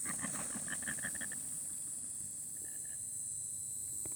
Sound effects > Animals
Water Birds - Anhinga; Croak and Flapping Wings
An anhinga, otherwise known as an American darter, flies away to another pond while vocalizing. Recorded with an LG Stylus 2022 at Hawk's Point Golf Course in Vidalia, Georgia, United States.
nature, snakebird, darter, croak, flap, swamp, wing, wetland, bird, anhinga, fly